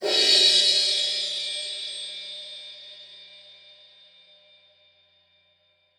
Instrument samples > Percussion
HR V10 Crash
synthetic drums processed to sound naturalistic
cymbals, drum, stereo, drums, digital, physical-modelling, sample, machine, one-shot